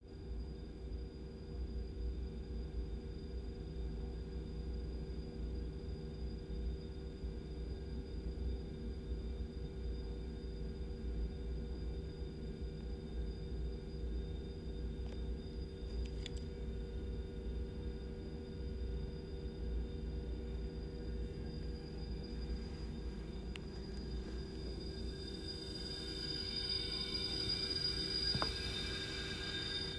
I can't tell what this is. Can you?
Soundscapes > Indoors
Women’s Bathroom in SAC Basement
Loud as hell bathroom in the basement of one of the buildings on my campus. There was also a freaky crack in the wall. Works best if you turn it up.
bathroom, drone